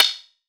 Instrument samples > Synths / Electronic
A wood-like percussion made in Surge XT, using FM synthesis.